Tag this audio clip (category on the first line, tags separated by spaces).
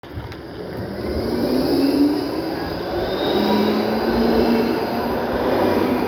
Soundscapes > Urban
rail tram tramway